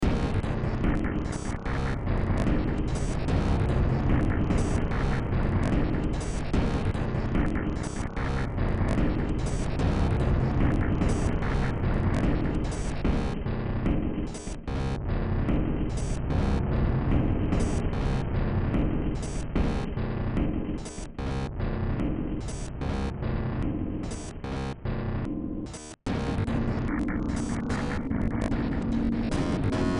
Music > Multiple instruments
Demo Track #3040 (Industraumatic)
Cyberpunk, Underground, Industrial, Noise, Horror, Ambient, Sci-fi, Soundtrack, Games